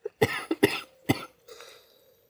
Sound effects > Human sounds and actions
Sick Man Coughing
Sick guy cough. Recorded Jan 11, 2025 in Bali using a Moto G34, cleaned up in Audacity. Includes dramatic, typo, and German tags.
coughs, kranker-mann, unwell, sicko, sickish, sick, husten, lung-infection, infiziert, deathly-ill, infected, bacterial-infection, caugh, coughing, krank, caughs, caughing, sickly, cough, hustet, viral-infection, virus, ill, under-the-weather